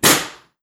Sound effects > Other mechanisms, engines, machines
TOOLPneu-Samsung Galaxy Smartphone Nail Gun, Burst 05 Nicholas Judy TDC
A nail gun burst.
burst, nail-gun, Phone-recording